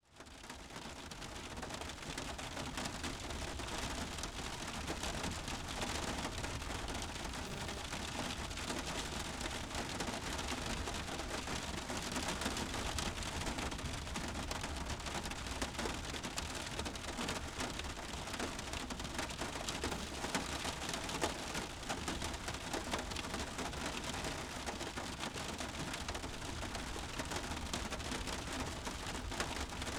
Sound effects > Natural elements and explosions
Raining on a car, but you are inside! the car is a Peugeot 407 recorded with a pair of clippy em172 recorded on zoom F3 France, dec 2025